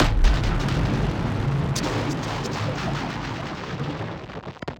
Sound effects > Experimental
destroyed glitchy impact fx -011
abstract, alien, clap, crack, edm, experimental, fx, glitch, glitchy, hiphop, idm, impact, impacts, laser, lazer, otherworldy, perc, percussion, pop, sfx, snap, whizz, zap